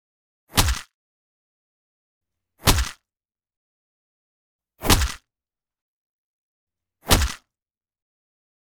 Sound effects > Human sounds and actions
kick w bone crunch inspired by tmnt 2012
punch sounds using wet towel, egg shells crunching and boxing gloves. inspired by tmnt 2012.